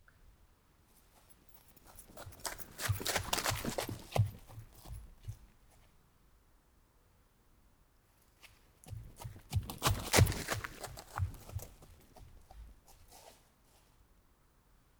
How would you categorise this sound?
Sound effects > Human sounds and actions